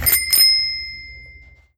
Sound effects > Vehicles
BELLMisc-Samsung Galaxy Smartphone, CU Bicycle, Old, Ringing Nicholas Judy TDC
An old bicycle bell ringing. Recorded at Goodwill.
bell, bicycle, old